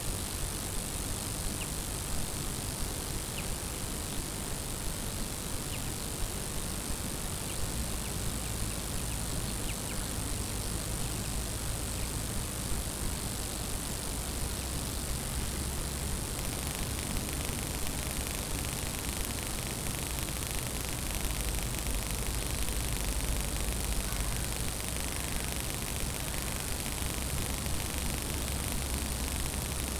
Soundscapes > Urban
2025 09 09 17h50ish Gergueil D35F - Between electric pylons - H2N MS150
Subject : Ambience recording of the electrical pillons when walking between Gergueil and Poisot. Positioned in between them :) Date YMD : 2025 September 09 17h50 ish Location : Gergueil 21410 Bourgogne-Franche-Comté Côte-d'Or France. GPS = 47.245333111172044, 4.824121603791355 Hardware : Zoom H2n MS150° mode. Set at 10 gain. Weather : Cloudy. Processing : Trimmed and added some gain in Audacity.